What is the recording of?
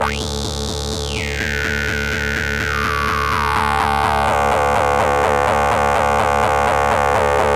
Music > Solo instrument
127 D KorgPoly800Wash Loop 01
Synth Melody made using Korg Poly-800 analog synth